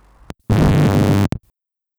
Sound effects > Electronic / Design
Powerline Glitch #2
A random Glitch I had while recording a humming Powerline with my Tascam DR40X.